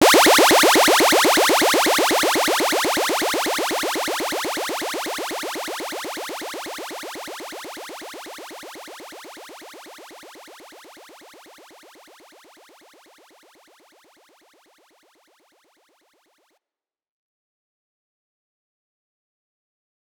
Sound effects > Electronic / Design
FX Laser Blaster
Sounds like a laser blaster Created with SynthMaster for iPad
blast, sound-effect, effect, FX, Laser, blasting